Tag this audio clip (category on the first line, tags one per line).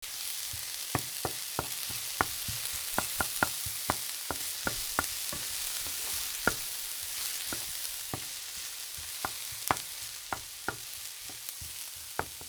Sound effects > Objects / House appliances
Kitchen
Cooking
Food
Household